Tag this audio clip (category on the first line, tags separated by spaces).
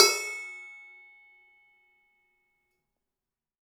Music > Solo instrument
Crash Cymbals Percussion FX Kit Hat Cymbal Metal Custom Drums Paiste GONG Perc Sabian Oneshot Drum Ride